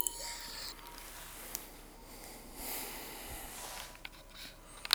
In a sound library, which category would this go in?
Sound effects > Objects / House appliances